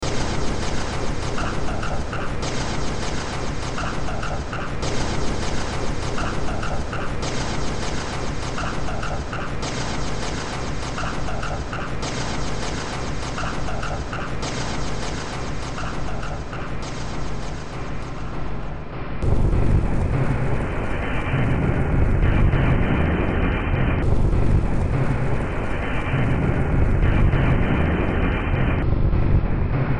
Music > Multiple instruments
Demo Track #3694 (Industraumatic)
Cyberpunk, Sci-fi, Industrial, Soundtrack, Noise, Horror, Underground, Games, Ambient